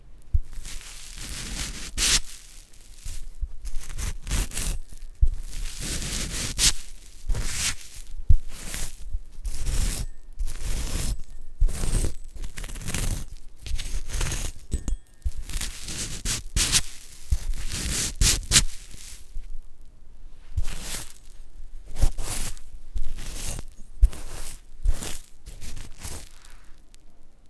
Sound effects > Objects / House appliances
Fabric side of sponge and fork
2025, Dare, Dare2025-08, Dare202508, Fabric, fork, FR-AV2, friction, NT5, Rode, rubbing, sponge, washing-dishes